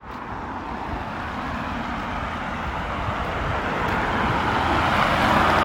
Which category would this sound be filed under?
Soundscapes > Urban